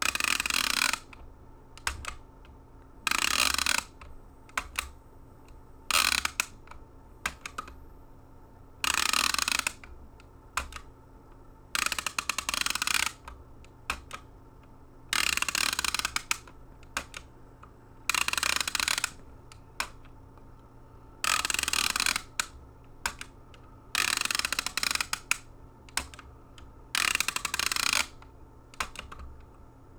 Sound effects > Other mechanisms, engines, machines
MECHMisc-Blue Snowball Microphone Desk Call, Phone Holder, Ratchet, Button Clicks, Also Comedic Nicholas Judy TDC
A desk call phone holder ratcheting and button clicking. Also used for a comedic effect.
foley, ratchet, Blue-brand, comedic, desk-call, Blue-Snowball, click, button, phone-holder